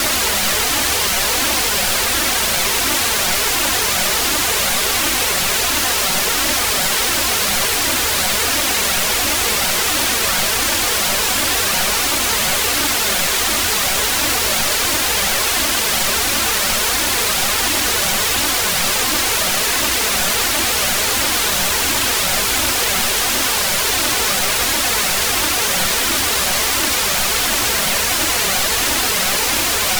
Instrument samples > Synths / Electronic
NOISE Chorus 2 Roland Juno 6
Noise Oscillator - Roland Juno 6 with Chorus 2